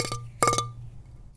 Music > Solo instrument
Marimba Loose Keys Notes Tones and Vibrations 11-001
A collection of samples using loose marimba keys in a box from a marimba manufacturer in Humboldt County, California. The keys were all rejects due to either mishapes or slightly off tone or timbre, but I sifted through thousnads and found some really nice gems to record. Cant wait to create some strange sounds with these
block, foley, fx, keys, loose, marimba, notes, oneshotes, perc, percussion, rustle, thud, tink, wood, woodblock